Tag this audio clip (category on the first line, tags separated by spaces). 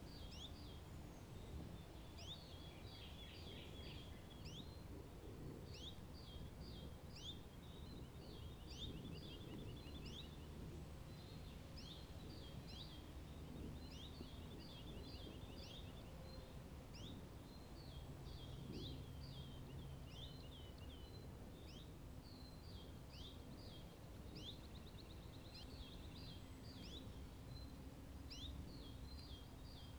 Soundscapes > Nature
greattit calm leaves field-recording ambience forest Quercus wind nature birds Pinaceae atmosphere background ambient Oak